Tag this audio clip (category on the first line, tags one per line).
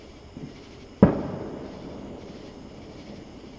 Sound effects > Other
samples
United-States
patriotic
day
explosions
electronic
fireworks
free-samples
fireworks-samples
sample-packs
sfx
america
independence
experimental